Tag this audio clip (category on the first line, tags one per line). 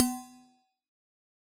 Sound effects > Objects / House appliances
percusive
recording
sampling